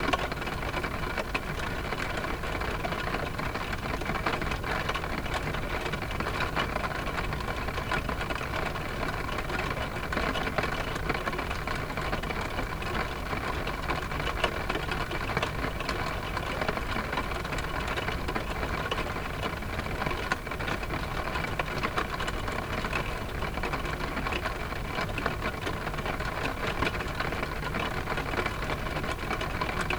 Soundscapes > Nature
The sound of the rain on the window positioned on the roof of the house